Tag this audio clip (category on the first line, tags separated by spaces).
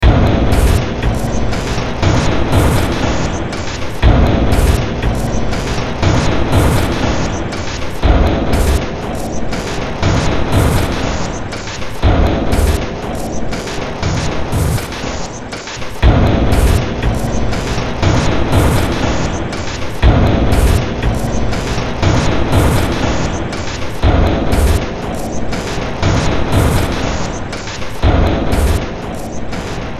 Music > Multiple instruments
Underground Sci-fi Ambient Soundtrack Industrial Cyberpunk Noise Games Horror